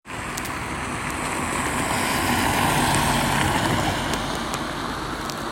Sound effects > Vehicles
car rain 04
A recording of a car passing by on Insinöörinkatu 30 in the Hervanta area of Tampere. It was collected on November 7th in the afternoon using iPhone 11. There was light rain and the ground was slightly wet. The sound includes the car engine and the noise from the tires on the wet road.
car, engine, rain, vehicle